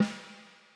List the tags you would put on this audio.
Music > Solo percussion
hit
rim
beat
flam
fx
drums
snareroll
crack
snare
brass
snares
rimshot
realdrum
percussion
ludwig
sfx
oneshot
snaredrum
drum
hits
kit
roll
reverb
drumkit
processed
realdrums
perc
rimshots
acoustic

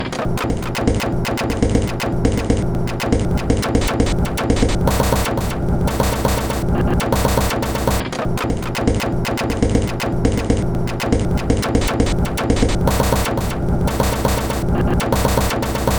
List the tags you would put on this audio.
Instrument samples > Percussion
Alien
Ambient
Dark
Drum
Loop
Loopable
Packs
Samples
Soundtrack
Underground
Weird

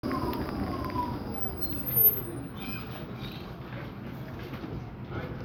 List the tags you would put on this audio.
Soundscapes > Urban
rail
tram
tramway

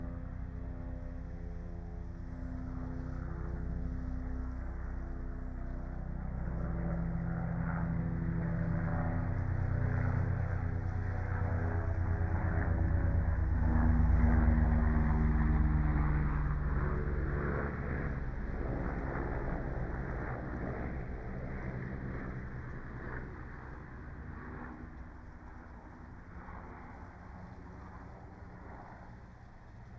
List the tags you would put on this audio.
Soundscapes > Nature
data-to-sound
nature
weather-data
field-recording
modified-soundscape
natural-soundscape
alice-holt-forest
phenological-recording
artistic-intervention
soundscape
sound-installation
raspberry-pi
Dendrophone

